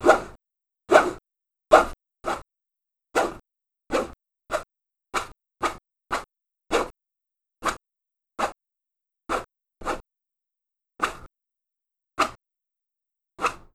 Sound effects > Objects / House appliances
SWSH-Blue Snowball Microphone, CU Swishes, Simulated Using Nails On Plastic Tray 03 Nicholas Judy TDC
Swishes. Simulated using fingernails scratching a plastic tray.
Blue-brand, swish